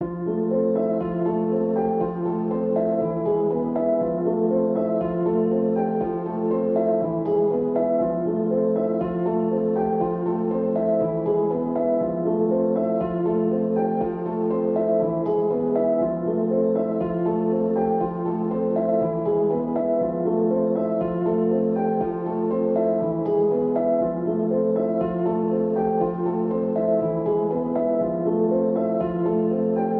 Music > Solo instrument
Piano loops 073 efect 4 octave long loop 120 bpm
120, 120bpm, free, loop, music, piano, pianomusic, reverb, samples, simple, simplesamples